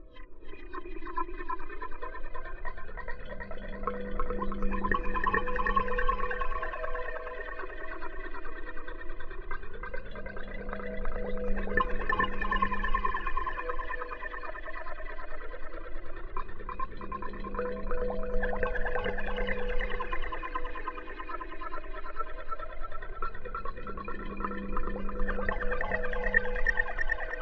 Sound effects > Experimental
Acid audio effect
This sample was made in an attempt to recreate the audio effects experienced under the influence of LSD. It was produced in FL Studio by adding various heavy flanger and delay effects to a dropping water sound.
acid, audio, distant, dreamlike, echo, effect, flanger, lsd, phaser, psychedelic, reverb, trippy, weird